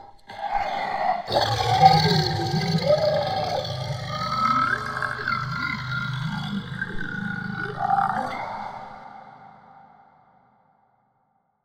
Sound effects > Experimental
Creature Monster Alien Vocal FX-28
Alien, Animal, boss, Creature, Deep, demon, devil, Echo, evil, Fantasy, Frightening, fx, gamedesign, Groan, Growl, gutteral, Monster, Monstrous, Ominous, Otherworldly, Reverberating, scary, sfx, Snarl, Snarling, Sound, Sounddesign, visceral, Vocal, Vox